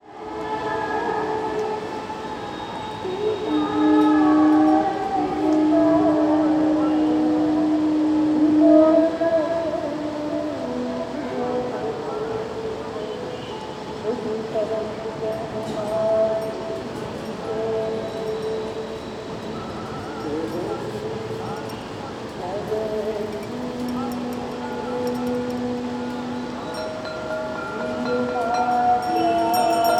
Soundscapes > Urban
Loud India (Puja time, night flow) Uttaranchal India Rishikesh Swargashram
Sound recorded in India where I explores the loudness produced by human activity, machines and environments in relation with society, religion and traditional culture.
bells, Hindu, Hinduism, Temple, Yoga